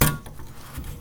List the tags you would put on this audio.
Sound effects > Other mechanisms, engines, machines
foley fx handsaw hit household metal metallic perc percussion plank saw sfx shop smack tool twang twangy vibe vibration

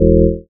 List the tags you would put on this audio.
Instrument samples > Synths / Electronic
fm-synthesis additive-synthesis bass

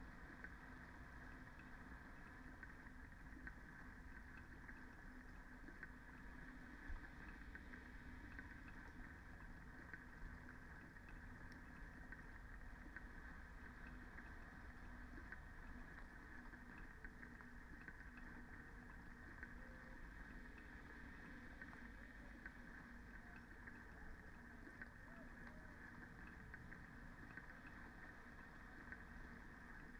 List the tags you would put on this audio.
Soundscapes > Nature
modified-soundscape soundscape field-recording phenological-recording alice-holt-forest natural-soundscape nature sound-installation artistic-intervention weather-data raspberry-pi Dendrophone data-to-sound

